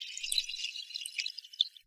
Sound effects > Objects / House appliances
Masonjar Shake 8 Texture
Shaking a 500ml glass mason jar half filled with water, recorded with an AKG C414 XLII microphone.
splash, water, mason-jar, trickle